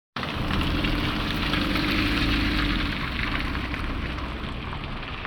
Sound effects > Vehicles
small peugeot
Car, field-recording, Tampere